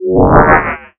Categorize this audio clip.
Instrument samples > Synths / Electronic